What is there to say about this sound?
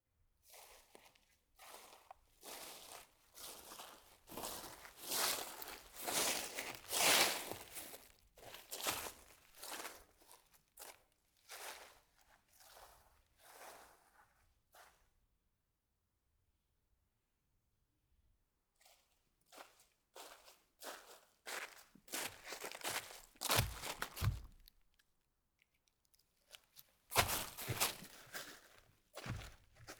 Sound effects > Human sounds and actions
walking in the forest (stereo)
Walking at moderate speed in the forest Location: Poland Time: November 2025 Recorder: Zoom H6 - XYH-6 Mic Capsule